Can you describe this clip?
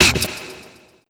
Sound effects > Electronic / Design
A short, intense whisper - just what did they just cast? Variation 3 of 4.